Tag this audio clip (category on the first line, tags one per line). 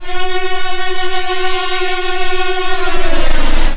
Instrument samples > Synths / Electronic
ambient
dark
lead
moody
noise
sound
synth